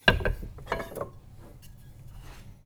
Music > Solo instrument
Marimba Loose Keys Notes Tones and Vibrations 33-001
block, foley, fx, keys, loose, marimba, notes, oneshotes, perc, percussion, rustle, thud, tink, wood, woodblock